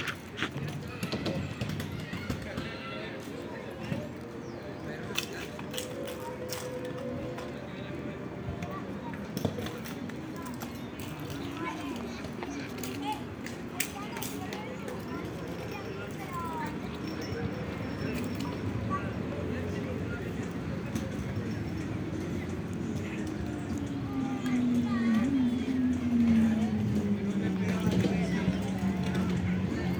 Soundscapes > Urban
Calisthenics park ambience in Almassora
Background sounds from a playground in Almazora Castellón, where we can hear the sounds of children playing and cars passing on the roads. Recorded on October 17, 2025.